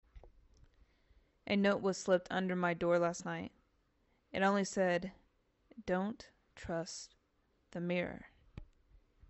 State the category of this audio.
Speech > Solo speech